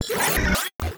Sound effects > Electronic / Design
One-shot Glitch SFX.